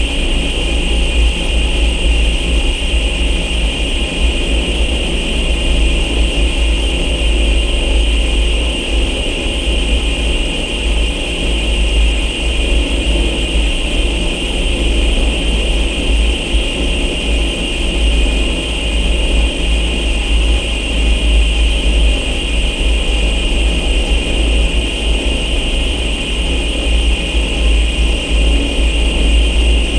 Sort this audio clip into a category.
Sound effects > Experimental